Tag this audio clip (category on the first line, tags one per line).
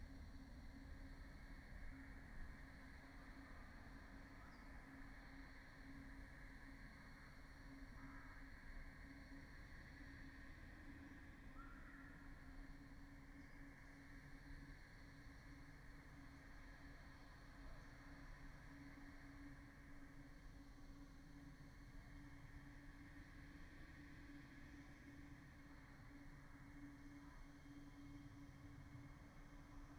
Soundscapes > Nature
natural-soundscape
Dendrophone
data-to-sound
alice-holt-forest
weather-data
field-recording
sound-installation
artistic-intervention
modified-soundscape
soundscape
phenological-recording
raspberry-pi
nature